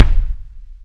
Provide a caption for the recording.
Instrument samples > Percussion
It's a clicky kick.